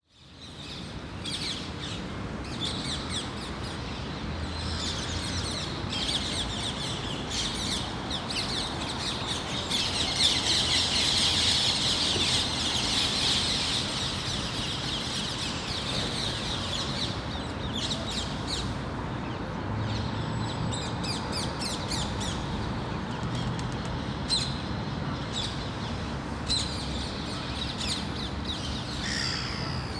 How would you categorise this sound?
Soundscapes > Urban